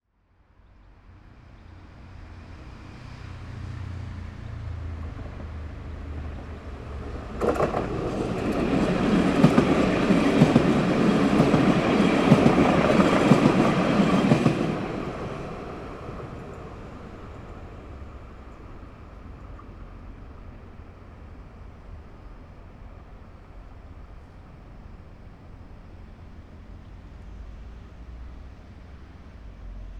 Sound effects > Vehicles
250617 172732 FR Tram passing by
Tram / short train passing by. (Take 2) Tramway passing from left to right, between Brimborion station and Musée de Sèvres station, on the T2 line, travelling through the western suburbs of Paris, France. In the background, traffic from the surrounding city. Recorded in June 2025 with a Zoom H5studio (built-in XY microphones). Fade in/out applied in Audacity.
ambience, atmosphere, city, field-recording, France, passing, public-transportation, rail, railway, Sevres, soundscape, suburban, town, traffic, train, tram, tramway, urban, wheels